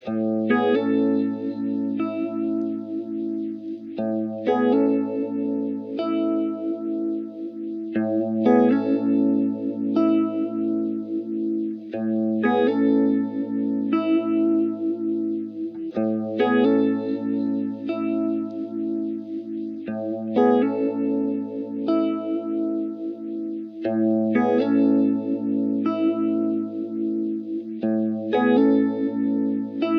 Music > Solo instrument
Guitar loops 126 02 verison 02 60.4 bpm
reverb, simplesamples, simple, electric, guitar, electricguitar